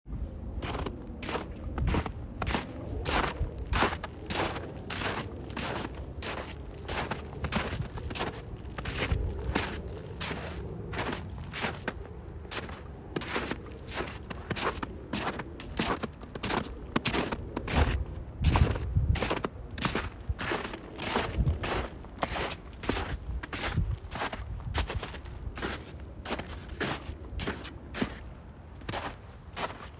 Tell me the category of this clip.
Sound effects > Human sounds and actions